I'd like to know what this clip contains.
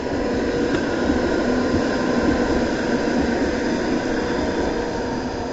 Soundscapes > Urban
Passing Tram 15
city, field-recording, outside, street, traffic, tram, trolley, urban